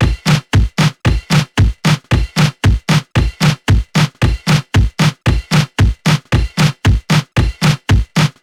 Instrument samples > Percussion
114 bpm - greasy punk rock beat
drumsbeat for u
drums, punk, rock, drumloop